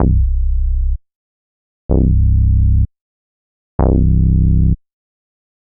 Instrument samples > Synths / Electronic
VSTi Elektrostudio (Model Pro)
vst, synth, bass, vsti